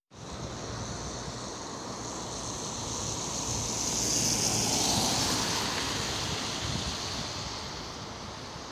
Sound effects > Vehicles
tampere car24
car passing by near Tampere city center
automobile, car, vechicle